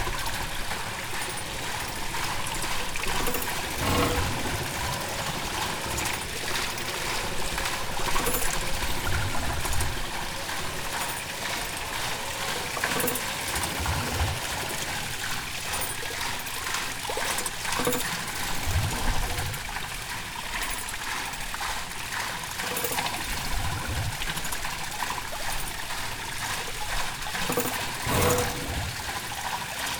Sound effects > Other mechanisms, engines, machines
Listen to this! Fun water feature consisting of a water wheel and various elements.
Feauture, water, waterwheel, gurgle